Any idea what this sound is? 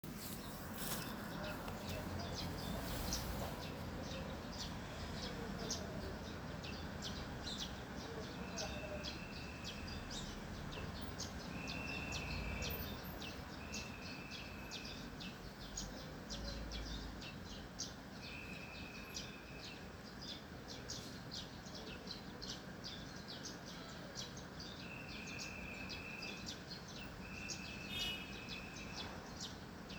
Soundscapes > Urban
Ambient sounds from my flat in West Africa. You can hear birds chirping in the trees and traffic police directing traffic. This was recorded during the afternoon rush hour where people tend to drive a little aggressively.